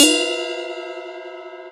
Instrument samples > Percussion
ride bell 1 long
I shortened the duration. It's a perfect ridebell for music! sustain-ride, bellride ridebell ride bell cymbal cymbals metal metallic ride-cymbal, time-cymbal, steady-cymbal rhythm-cymbal, pulse-cymbal, groove-cymbal heavy-ride, light-ride, jazz-ride, ping-ride, crash-ride washy washy-cymbal dry-ride overtone-ride metal metallic death death-metal rock jazz heavy cymbal-bell, dome, center-spot bright-zone, accent-point, strike-zone ping-zone, high-tone-area, chime zone ping clang chang